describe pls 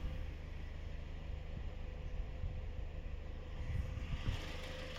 Sound effects > Other mechanisms, engines, machines
clip auto (8)
Avensis, Auto, Toyota